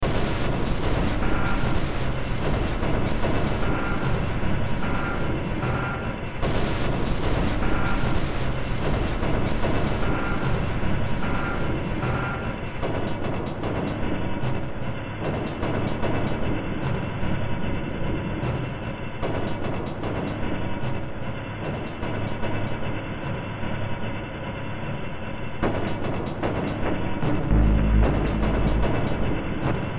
Music > Multiple instruments
Demo Track #3925 (Industraumatic)
Ambient, Cyberpunk, Games, Horror, Industrial, Noise, Sci-fi, Soundtrack, Underground